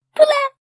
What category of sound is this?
Sound effects > Other